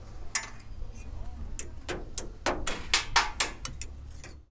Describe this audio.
Sound effects > Objects / House appliances
Junkyard Foley and FX Percs (Metal, Clanks, Scrapes, Bangs, Scrap, and Machines) 100
Machine, scrape, Smash, rubbish, Foley, SFX, dumping, Junkyard, dumpster, rattle, Atmosphere, Robotic, Metal, waste, Dump, Bash, Percussion, garbage, Clang, Perc, Clank, Ambience, Metallic, Bang, FX, Junk, trash, tube, Robot, Environment